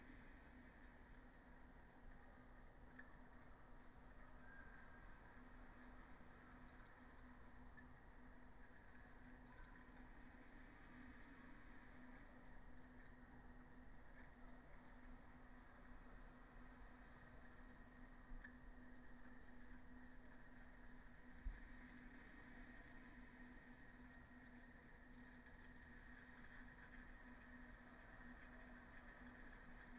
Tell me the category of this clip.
Soundscapes > Nature